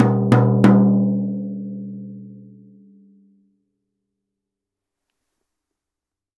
Music > Solo instrument
Toms Misc Perc Hits and Rhythms-009
Cymbal, FX, Drums, Paiste, Oneshot, Kit, Hat, Metal, Crash, Custom, Drum, Sabian, GONG, Cymbals, Percussion, Ride, Perc